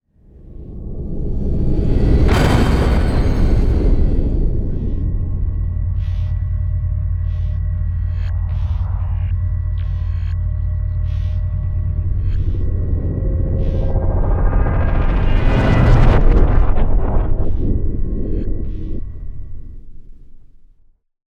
Sound effects > Other
Sound Design Elements SFX PS 062
bass, boom, cinematic, deep, effect, epic, explosion, game, hit, impact, implosion, indent, industrial, metal, movement, reveal, riser, stinger, sub, sweep, tension, trailer, transition, video, whoosh